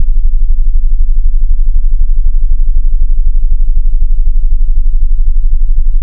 Sound effects > Electronic / Design
20 Hz + golden ratio loopy
20-Hz, bass, bassbase, basshum, basspulse, bassthrob, deep, electronic, foundation, Fourier, fundamental, fundamentals, harmonics, hum, Hz, kicksine, Lissajous, low, low-end, megabass, sinemix, sinewave, sinewaves, sinusoid, soundbuilding, subspectral, superbass, ultrabass